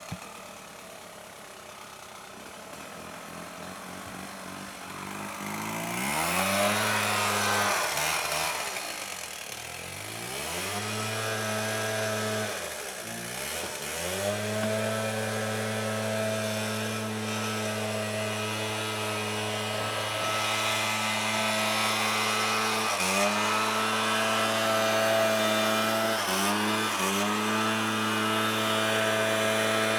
Soundscapes > Other
Stihl gas powered blower
Crew from Malamute Tree Services uses gas-powered backpack blower to clean wood debris from sidewalk and street in Riverdale neighbourhood of Whitehorse, Yukon. Recorded on handheld Zoom H2n in stereo on August 26, 2025.
city, gas-powered-blower, whitehorse, yukon, stihl, engine, noise, blower, leaf-blower, riverdale, field-recording, tree-pruning